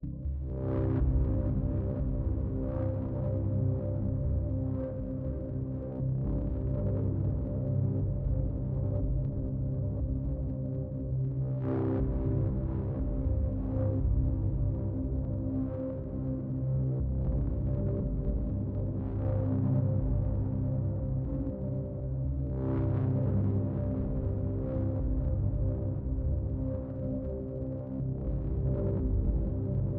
Music > Multiple instruments
A Simple Procedural music loop that was made through pigments and it's sequencer and processed through different studio one native & AIR plugins, this is actually the more completed version of the previous music loop that I uploaded, the first one only contains a tension part but this version builds up to a more intensive feeling of tension
BackgroundMusic, synthetic, ambient, Loop, action, dramatic, GameMusic, drama, suspense, adaptive, Music